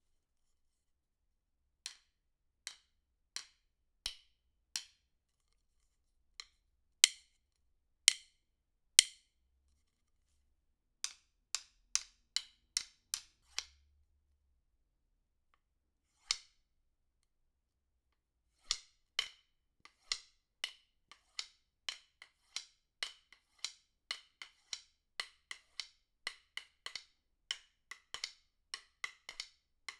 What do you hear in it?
Instrument samples > Percussion
Drumsticks random noises
Subject : Doing random things with drum sticks, hitting them gripping with different strengths, hitting different places, doing whooshes, and handling noise. Date YMD : 2025 July 01. Location : Albi 81000 Tarn Occitanie France. Hardware : Tascam FR-AV2 Rode NT5 Weather : Sunny, no cloud/wind 38°c 40%humidity. Processing : Trimmed in Audacity.